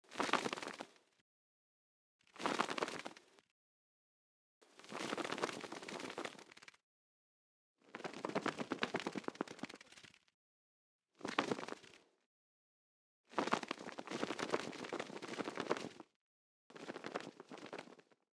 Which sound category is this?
Sound effects > Animals